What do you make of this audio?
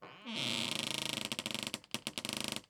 Sound effects > Objects / House appliances
wooden door creak6
creaking, door, horror